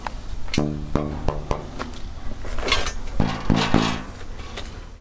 Sound effects > Objects / House appliances
Junkyard Foley and FX Percs (Metal, Clanks, Scrapes, Bangs, Scrap, and Machines) 110
Smash, scrape, garbage, waste, Machine, Perc, Bang, Clank, Atmosphere, Ambience, rattle